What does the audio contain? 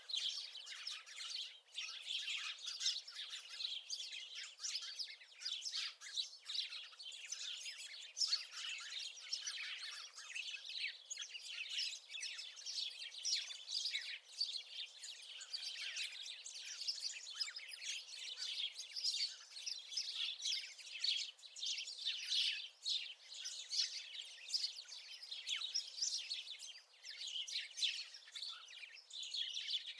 Sound effects > Animals
flock of sparrows 1
Flock of sparrows chirping. Location: Poland Time: November 2025 Recorder: Zoom H6 - SGH-6 Shotgun Mic Capsule
flock, tweeting, birds, chirping, sparrow